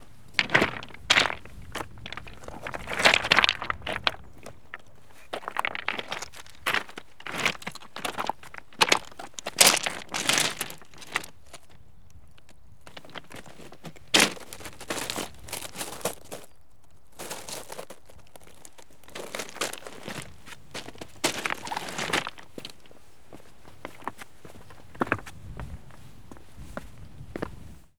Soundscapes > Nature
Footsteps recorded on pebbles at Ushant, recorded with a Zoom H4N Pro